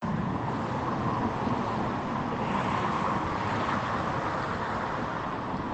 Sound effects > Vehicles
cars passing5
Multiple cars driving on a busy wet asphalt road, 10 to 20 meters away. Recorded in an urban setting in a near-zero temperature, using the default device microphone of a Samsung Galaxy S20+.